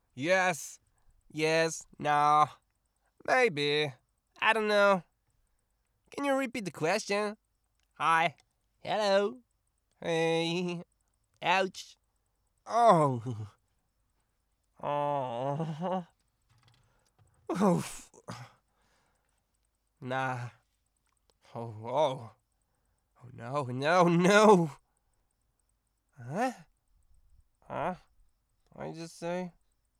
Speech > Solo speech
Surfer dude - kit - Generic lines kit
Subject : A bunch of generic voice lines and words/sentences. By OMAT in his van, with a surfer like tone. More in pack! Date YMD : 2025 August 06 Location : At “Vue de tout Albi” in a van, Albi 81000 Tarn Occitanie France. Shure SM57 with a A2WS windshield. Weather : Sunny and hot, a little windy. Processing : Trimmed, some gain adjustment, tried not to mess too much with it recording to recording. Done in Audacity. Some fade in/out if a one-shot. Notes : Some cringe sentence was said. We're aware it's poor and in retrospect I should have cut out the very end "laugh" sequence with the inappropriate "compliments" / comments. Please know, we were on a fun recording session and mood, and is not a thing we would say to someone or about someone IRL. While editing I did ask are sure you want to leave it in? "Yes" So I obliged. We shouldn't have built a character that objectifies women like this.
2025, 20s, A2WS, Adult, Dude, English-language, France, FR-AV2, generic, generic-lines, In-vehicle, kit, lines, Male, mid-20s, no, over-all, pack, phrases, RAW, sentences, Single-mic-mono, SM57, Surfer, surfer-dude, Tascam, VA, Voice-acting, yes